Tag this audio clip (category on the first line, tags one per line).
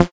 Instrument samples > Synths / Electronic
bass
additive-synthesis
fm-synthesis